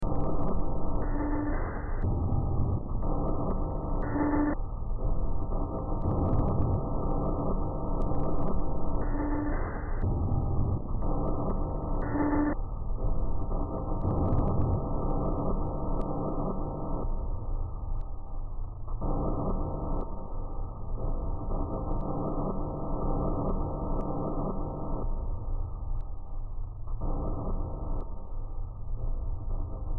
Music > Multiple instruments
Demo Track #3377 (Industraumatic)
Ambient, Cyberpunk, Games, Horror, Industrial, Noise, Sci-fi, Soundtrack, Underground